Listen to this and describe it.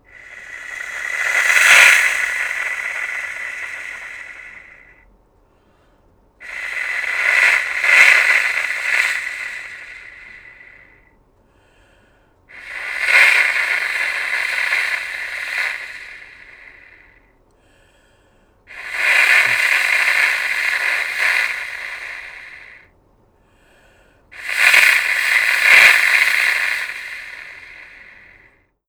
Soundscapes > Nature
WINDDsgn-Blue Snowball Microphone, CU Gusts, Acme Windmaster Nicholas Judy TDC
Wind gusts. Simulated using an Acme Windmaster.
acme-windmaster, gust, wind